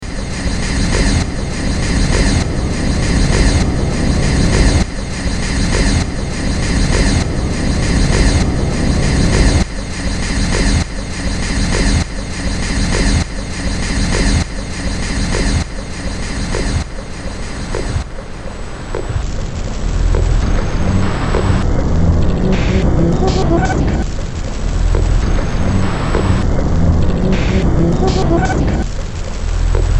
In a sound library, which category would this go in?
Music > Multiple instruments